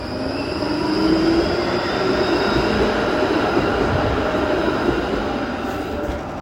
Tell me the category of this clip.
Sound effects > Vehicles